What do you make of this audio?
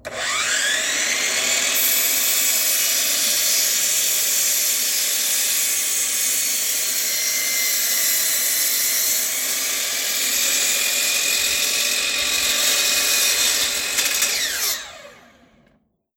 Sound effects > Other mechanisms, engines, machines
TOOLPowr-Samsung Galaxy Smartphone, CU Hercules Electric Saw, Cutting Wood Nicholas Judy TDC
A Hercules electric saw cutting wood.
Phone-recording, wood, cut, electric-saw